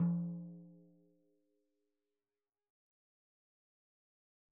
Music > Solo percussion
Hi Tom- Oneshots - 20- 10 inch by 8 inch Sonor Force 3007 Maple Rack
acoustic, beat, beatloop, beats, drum, drumkit, drums, fill, flam, hi-tom, hitom, instrument, kit, oneshot, perc, percs, percussion, rim, rimshot, roll, studio, tom, tomdrum, toms, velocity